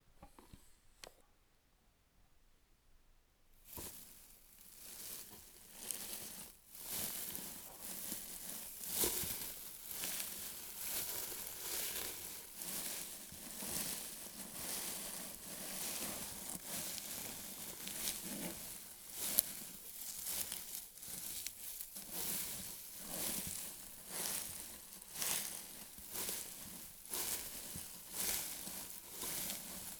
Sound effects > Human sounds and actions
Walking on Grass 2
recorded on a Zoom H2n
Walking, feet, grass